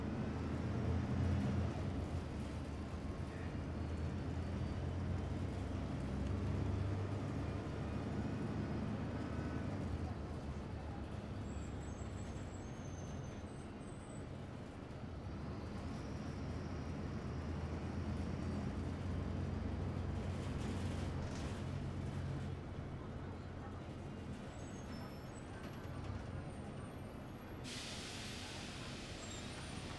Soundscapes > Urban
Getting off the Bus and into the City(ST)
Recorded with Zoom H6studio XY built-in microphones.